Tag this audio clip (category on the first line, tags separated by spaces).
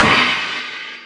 Instrument samples > Percussion
Avedis China clang crack crash crunch cymbal Istanbul low-pitched Meinl metal multi-China multicrash Paiste polycrash Sabian shimmer sinocrash smash Soultone spock Stagg Zildjian Zultan